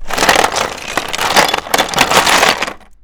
Sound effects > Objects / House appliances
ice cubes movement in tray1
tray, ice, cubes